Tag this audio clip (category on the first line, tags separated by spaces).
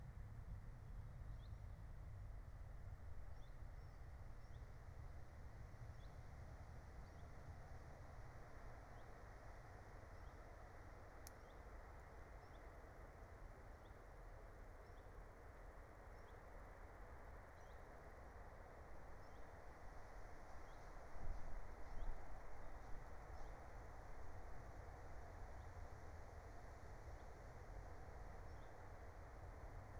Soundscapes > Nature
alice-holt-forest nature raspberry-pi meadow phenological-recording natural-soundscape field-recording soundscape